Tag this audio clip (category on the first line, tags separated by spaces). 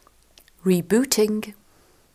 Speech > Solo speech
sfx computer status-message voice computing dry diy vocal alert spoken robot raspberry-pi command english-accent woman voice-prompt notification female rebooting